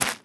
Sound effects > Human sounds and actions
SFX GravelCrunch4
Recorded on ZoomH1n and processed with Logic Pro.